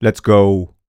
Speech > Solo speech
Lets go
chant, dry, FR-AV2, go, hype, lets, lets-go, Male, Man, Mid-20s, Neumann, oneshot, raw, singletake, Single-take, Tascam, U67, un-edited, Vocal, voice